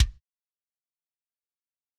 Instrument samples > Percussion
Kickdrum sample ready to use in the Roland TM-2. This is an own recorded sample.
drumkit, drums, kick, sample, trigger